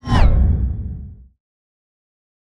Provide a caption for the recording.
Sound effects > Other
Sound Design Elements Whoosh SFX 029
ambient, audio, cinematic, design, dynamic, effect, effects, element, elements, fast, film, fx, motion, movement, production, sound, sweeping, swoosh, trailer, transition, whoosh